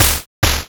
Instrument samples > Percussion
[CAF8bitV2]8-bit Snare1-G Key-Dry&Wet
Snare, Game, 8bit